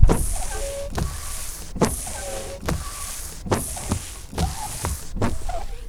Sound effects > Vehicles
Ford 115 T350 - Windscreen wiper (edge top)
115, 2003, 2003-model, 2025, A2WS, August, Ford, Ford-Transit, France, FR-AV2, Mono, Old, Single-mic-mono, SM57, T350, Tascam, Van, Vehicle